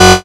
Instrument samples > Synths / Electronic
DRILLBASS 4 Gb
bass, fm-synthesis, additive-synthesis